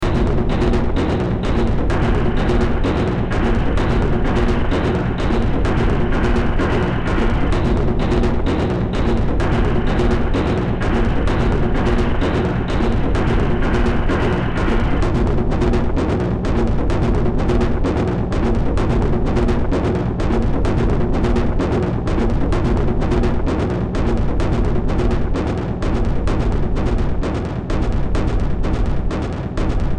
Music > Multiple instruments
Demo Track #3334 (Industraumatic)
Ambient, Cyberpunk, Games, Horror, Industrial, Noise, Sci-fi, Soundtrack, Underground